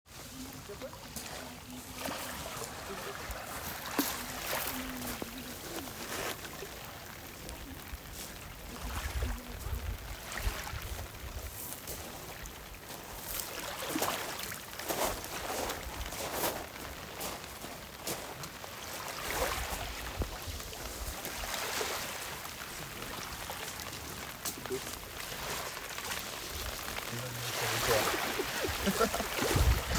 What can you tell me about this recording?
Soundscapes > Nature
Waves beach rain
Sounds of Saména beach in Calanques National Park.
Calanques, beach, nature